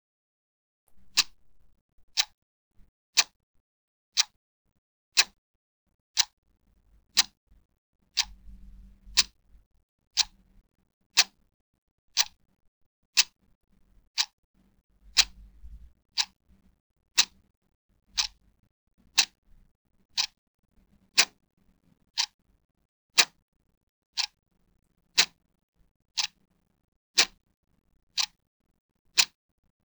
Sound effects > Objects / House appliances
The ticking of the Clock
This sound is made by a motorcycle clock .